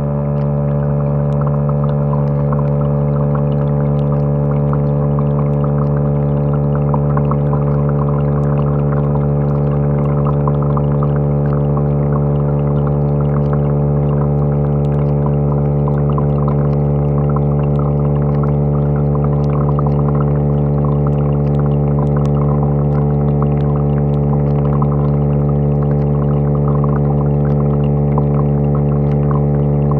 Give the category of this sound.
Sound effects > Objects / House appliances